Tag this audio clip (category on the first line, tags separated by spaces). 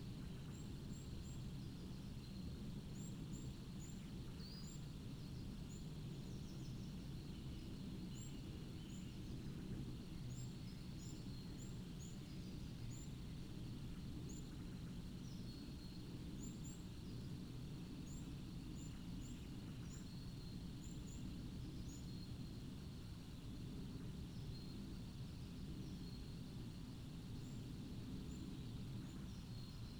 Soundscapes > Nature
phenological-recording data-to-sound nature natural-soundscape artistic-intervention soundscape Dendrophone field-recording modified-soundscape alice-holt-forest weather-data raspberry-pi sound-installation